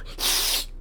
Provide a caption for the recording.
Sound effects > Animals
Cat hissing #2
More of me trying to hiss